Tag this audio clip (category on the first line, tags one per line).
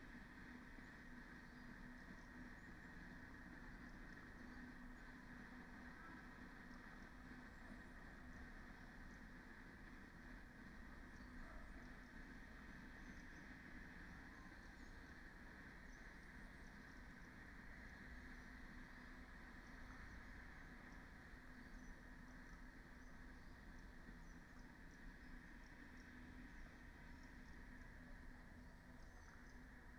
Soundscapes > Nature
field-recording
nature
data-to-sound
artistic-intervention
raspberry-pi
natural-soundscape
sound-installation
modified-soundscape
phenological-recording
Dendrophone
alice-holt-forest
soundscape
weather-data